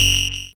Sound effects > Experimental
Analog Bass, Sweeps, and FX-003
retro, analog, pad, snythesizer, synth, sfx, basses, sample, alien, effect, vintage, analogue, electronic, robot, weird, scifi, trippy, oneshot, sci-fi, fx, dark, korg, electro, bass, mechanical, complex, robotic, bassy, machine, sweep